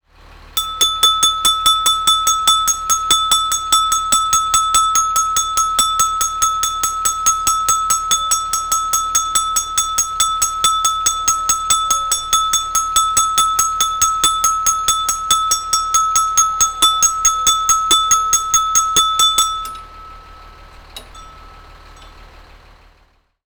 Soundscapes > Urban
Campana camion de basura - El Salvador
Percussive sound. Garbage truck bell in Sonsante, San Salvador. November 2024.
america, bell, central, el, field, garbage, recording, salvador, truck